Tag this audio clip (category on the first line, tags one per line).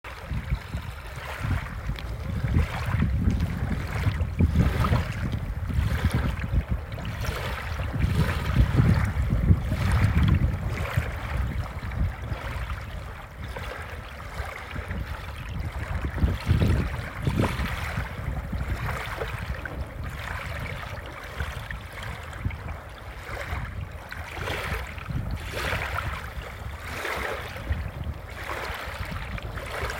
Sound effects > Natural elements and explosions
ambiance
ambient
field-recording
nature
pond
water
wind